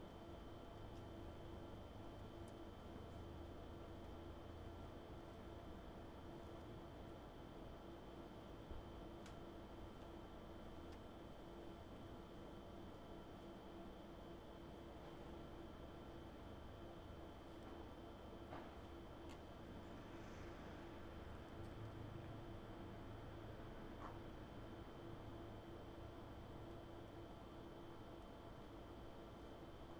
Soundscapes > Urban
250811 05h30 Albi Madeleine Gare - Train (NT5-o)
Subject : Date YMD : 2025 August 11 Early morning : Location : Albi 81000 Tarn Occitanie France. NT5 with a omni capsule (NT5-o). Weather : 24°c ish 60% humidity clear sky, little to no wind (said 10km/h, most locations I was was shielded) Processing : Trimmed and normalised in Audacity.
FR-AV2, NT5o, Occitanie, Tarn, 2025, August, Omni, Tascam, Early-morning, Albi, 81000, France, Mono, Rode, Albi-Madeleine, Single-mic-mono, City, NT5-o, train, Night